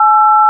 Sound effects > Electronic / Design
This is the number 8 in DTMF This is also apart of the pack 'DTMF tones 0-9'